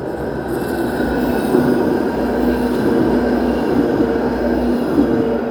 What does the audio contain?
Soundscapes > Urban
voice 11 18-11-2025 tram
Rattikka, Tram, TramInTampere